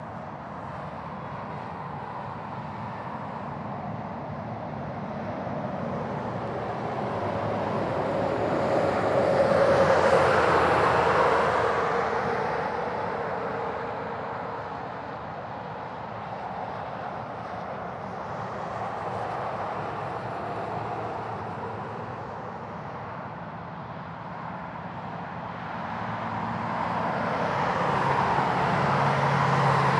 Soundscapes > Urban
Cars and trucks passing by on a wet highway. Low to moderate traffic.